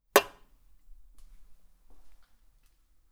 Sound effects > Other mechanisms, engines, machines
Woodshop Foley-069
perc, knock, bang, sound, tink, percussion, strike, sfx, wood, metal, foley, little, pop, rustle, boom, bop, oneshot, crackle, shop, thud, fx, tools, bam